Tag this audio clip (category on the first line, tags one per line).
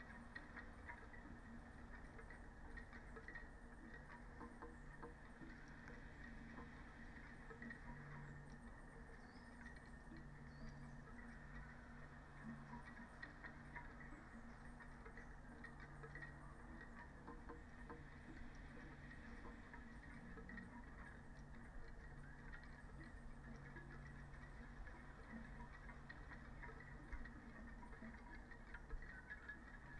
Soundscapes > Nature
phenological-recording
nature
soundscape
field-recording
natural-soundscape
weather-data
Dendrophone
modified-soundscape
alice-holt-forest
data-to-sound
raspberry-pi
artistic-intervention
sound-installation